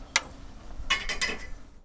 Sound effects > Objects / House appliances
Junkyard Foley and FX Percs (Metal, Clanks, Scrapes, Bangs, Scrap, and Machines) 30
Ambience, Atmosphere, Bang, Clang, Clank, Dump, dumping, dumpster, Environment, garbage, Junk, Junkyard, Machine, Perc, Percussion, rattle, Robot, rubbish, scrape, SFX, trash, tube